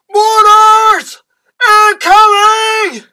Speech > Solo speech
Yelling mortars incoming